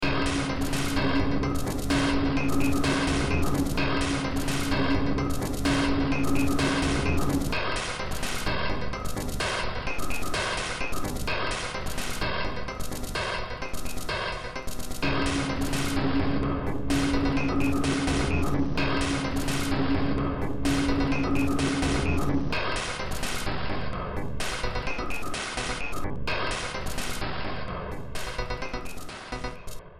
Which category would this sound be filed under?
Music > Multiple instruments